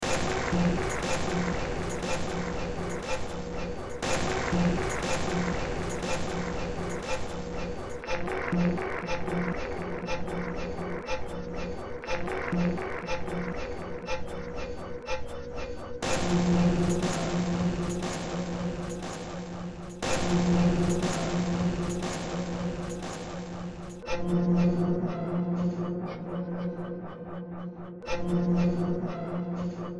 Music > Multiple instruments
Demo Track #3150 (Industraumatic)
Ambient, Cyberpunk, Horror, Underground, Noise, Industrial, Soundtrack, Games, Sci-fi